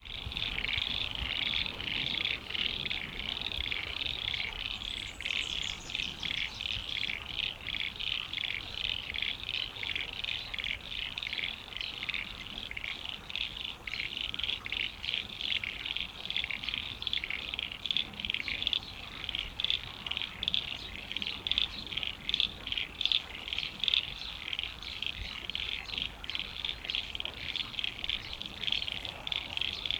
Soundscapes > Nature
Frogs in an urban wetland. Not a great ambience track but the rythmic sounds may be useful for sound design.